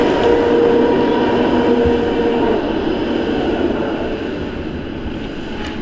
Sound effects > Vehicles
Tram00086251TramPassingBy
Audio of a tram passing nearby. Recording was taken during winter. Recorded at Tampere, Hervanta. The recording was done using the Rode VideoMic.
city, field-recording, tram, tramway, transportation, vehicle, winter